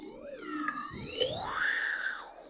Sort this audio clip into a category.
Sound effects > Objects / House appliances